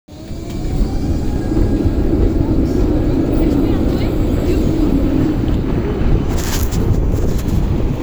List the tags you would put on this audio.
Sound effects > Vehicles
rail vehicle tram

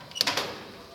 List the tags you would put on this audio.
Sound effects > Objects / House appliances
close door latch metal shut

